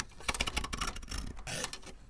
Sound effects > Other mechanisms, engines, machines
metal shop foley -112
bam, bang, boom, bop, crackle, foley, fx, knock, little, metal, oneshot, perc, percussion, pop, rustle, sfx, shop, sound, strike, thud, tink, tools, wood